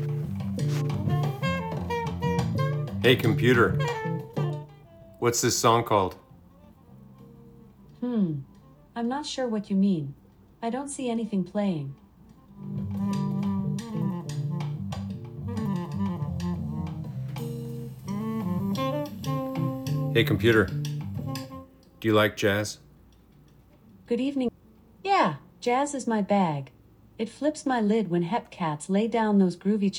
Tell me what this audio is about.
Speech > Solo speech
Alexa confused/Lying about instructions and liking Jazz, recorded on iPhone16